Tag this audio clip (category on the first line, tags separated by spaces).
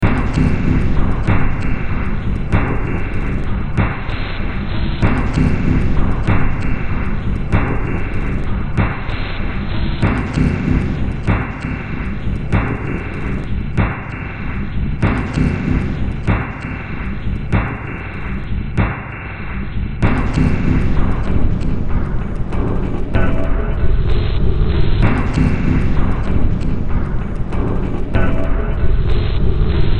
Music > Multiple instruments
Horror Soundtrack Cyberpunk Games Underground